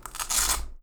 Sound effects > Objects / House appliances
CLOTHRip-Blue Snowball Microphone, CU Baseball Mitt, Velcro, Fast Nicholas Judy TDC
A fast baseball mitt velcro rip.